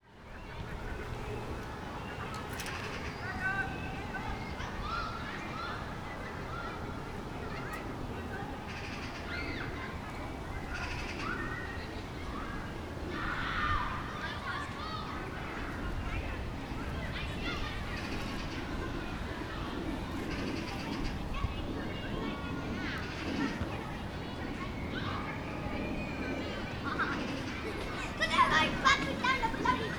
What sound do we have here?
Soundscapes > Nature
Ambient park sounds recorded on a sunny Saturday evening in late spring.